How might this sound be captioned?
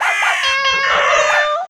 Music > Multiple instruments
Dogs Cats Crows Loop
A music loop that uses cat, dog and crow sounds as instruments, 140bpm, made in FL Studio after instantly giving up on trying to make the export not clip in LMMS. Sounds used originally are from the uploads:
140bpm, animal, bark, cat, caw, chaos, chaotic, crow, dog, loop, meow, music, weird